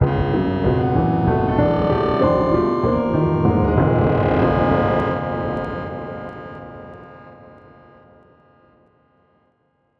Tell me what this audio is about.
Music > Other
Unpiano Sounds 016
Samples of piano I programmed on a DAW and then applied effects to until they were less piano-ish in their timbre.
Distorted-Piano, Piano, Distorted